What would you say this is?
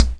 Instrument samples > Percussion
Chopped S perc
Subject : The end of me saying "Yes" chopping the S to have a hat like sound. Date YMD : 2025 04 11 Location : Rivesaltes Hardware : Neumann U67 and Tascam FR-AV2 Weather : Processing : Trimmed and Normalized in Audacity, Fade in/out.